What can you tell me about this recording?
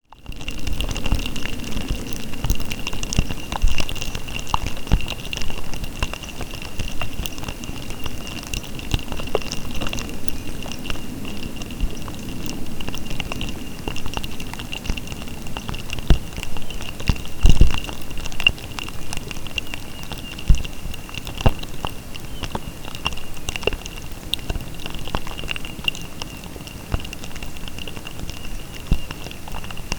Soundscapes > Nature
Moss Movement with Bird Resonance
A recording of the sounds that moss makes inside itself. A distant bird can be heard resonating as well. Recorded using a Metal Marshmellow Pro contact mic and a Zoom F3 field recorder.
ambient, bird, contact-mic, field-recording, forest, moss, nature, plants, sound-design, woods